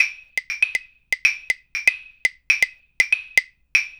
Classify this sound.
Music > Solo percussion